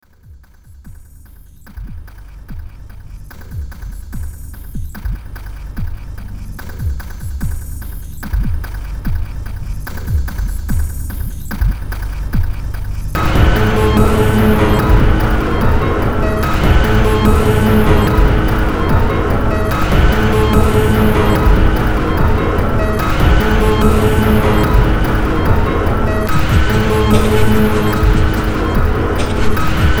Music > Multiple instruments
Demo Track #3753 (Industraumatic)
Ambient, Cyberpunk, Games, Horror, Industrial, Noise, Sci-fi, Soundtrack, Underground